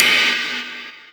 Instrument samples > Percussion
crash HHX 1
clash, crack, crunch, Istanbul, metal, shimmer, sinocymbal, Soultone, Zildjian